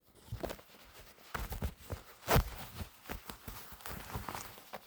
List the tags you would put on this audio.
Sound effects > Other
Clothing Jeans Unzipping Zipper

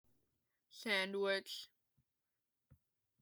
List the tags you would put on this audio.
Speech > Other
girl speak female woman vocal talk american voice english

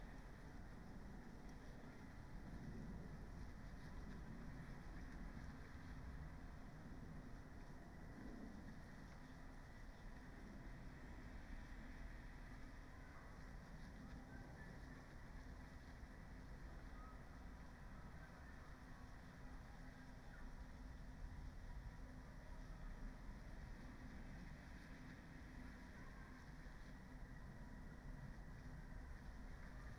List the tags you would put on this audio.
Soundscapes > Nature
soundscape modified-soundscape data-to-sound nature raspberry-pi field-recording sound-installation phenological-recording alice-holt-forest natural-soundscape weather-data Dendrophone artistic-intervention

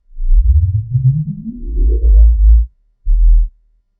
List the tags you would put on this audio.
Sound effects > Electronic / Design
oscillation experimental

